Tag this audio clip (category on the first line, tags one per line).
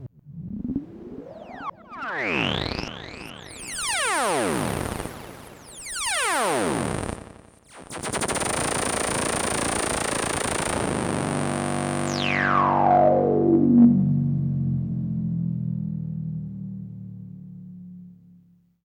Sound effects > Experimental
korg
robot
sweep
trippy
sfx
bass
vintage
electro
effect
sample
machine
oneshot
robotic
basses
dark
alien
bassy
weird
mechanical
scifi
analogue
electronic
pad
sci-fi
analog
complex
fx
snythesizer
synth
retro